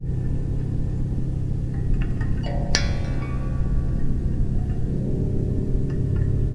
Soundscapes > Nature
Text-AeoBert-Pad-pings-10
pings, rain, storm, aeolian, moody, swells